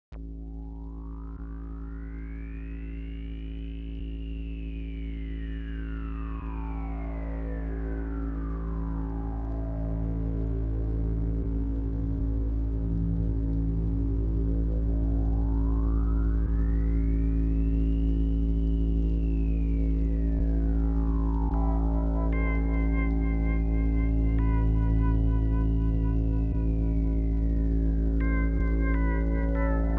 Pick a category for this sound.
Music > Other